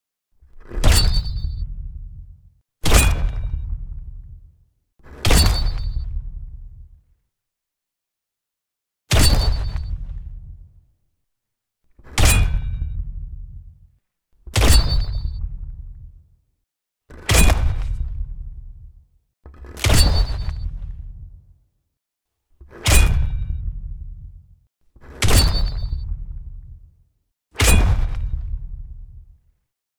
Sound effects > Animals
custom monster footstep sound Super Shredder variant 01132025
super shredder inspired bladed footstep sounds.